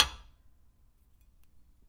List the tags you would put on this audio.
Sound effects > Other mechanisms, engines, machines
wood
shop
sound
tink
bam
pop
little
percussion
sfx
oneshot
bop
tools
knock
thud
bang
rustle
fx
metal
foley
boom

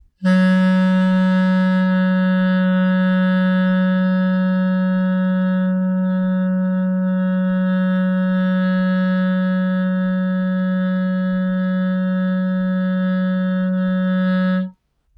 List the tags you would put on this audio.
Instrument samples > Wind
Wind
Clarinet
Sustained

